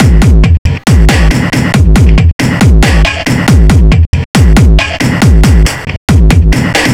Music > Other
IDM loop 138 bpm
FL STUDIO 9 . vst slicex + kick IDM conception
drums, drumloop, break, breakbeat, drumbeat, IDM, bass, beat